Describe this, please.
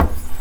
Sound effects > Other mechanisms, engines, machines
metal shop foley -009
bam, bang, boom, bop, crackle, foley, fx, knock, little, metal, oneshot, perc, percussion, pop, rustle, sfx, shop, sound, strike, thud, tink, tools, wood